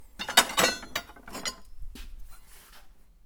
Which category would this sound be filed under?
Sound effects > Other mechanisms, engines, machines